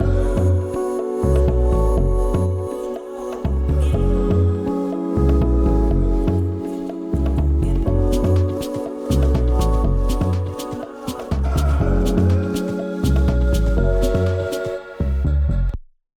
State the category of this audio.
Music > Multiple instruments